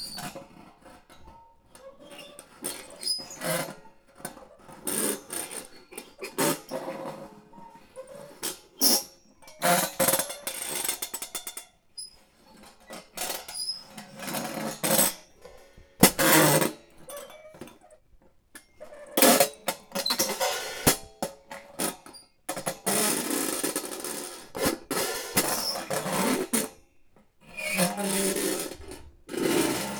Instrument samples > Percussion
drum Scratch STE-003
drum Scratch in the studio recorded in zoom h4n
kick, Scratch, drum, bassdrum, hi-hats, hihats, bass-drum